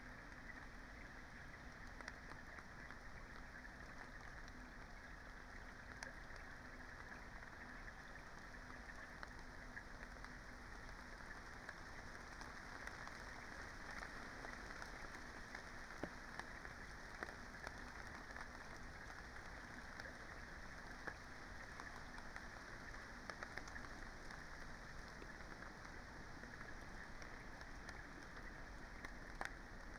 Soundscapes > Nature
Dendrophone is a site-specific sound installation by Peter Batchelor located in Alice Holt Forest, Surrey, UK, that transforms local environmental data into immersive sound textures. These recordings are made directly from the installation’s multichannel output and capture both its generative soundscape and the ambient natural environment. The sounds respond in real-time to three key ecological variables: • Humidity – represented sonically by dry, crackling textures or damp, flowing ones depending on forest moisture levels. • Sunlight energy – conveyed through shifting hissing sounds, juddery when photosynthetic activity is high, smoother when it's low. • Carbon dioxide levels – expressed through breathing-like sounds: long and steady when uptake is high, shorter and erratic when it's reduced. The installation runs on a DIY multichannel system based on Raspberry Pi Zero microcomputers and low-energy amplifiers.